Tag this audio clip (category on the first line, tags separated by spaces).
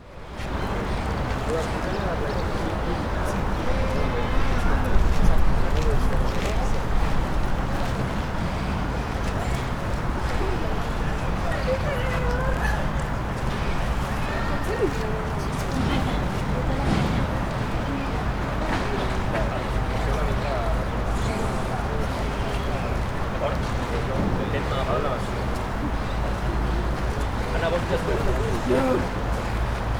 Soundscapes > Urban
Jardins People Quiet Montbau Nature